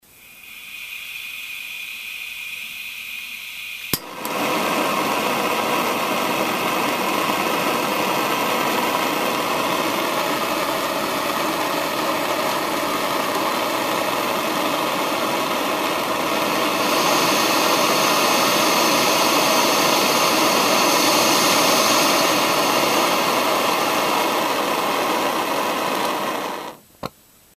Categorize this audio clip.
Sound effects > Other mechanisms, engines, machines